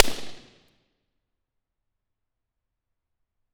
Soundscapes > Other
I&R Place Esperaza - 2700 x 6900cm ish - OKM1
Subject : An Impulse and response (not just the response) of the Square in Esperaza. Recorded at night. Date YMD : 2025 July 11 at 02h17 Location : Espéraza 11260 Aude France. Recorded with a Soundman OKM1 Weather : Processing : Trimmed in Audacity.